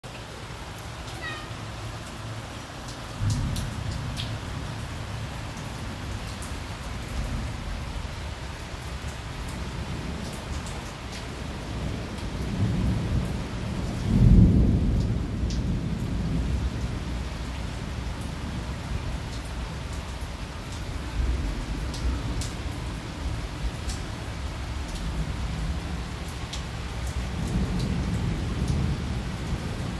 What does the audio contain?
Soundscapes > Nature
Thunderstorm From Outdoors On Balcony With Traffic
This was recorded fairly near a main road and airport (and loads of cats so theres a few meows in there)